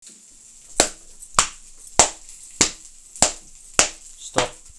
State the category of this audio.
Sound effects > Objects / House appliances